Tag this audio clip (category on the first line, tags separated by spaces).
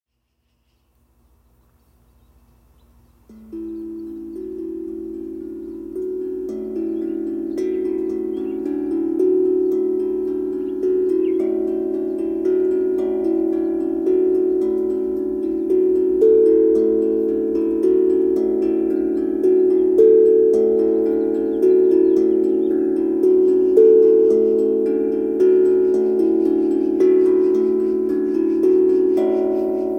Music > Solo instrument
Dreamscape Reverb Wave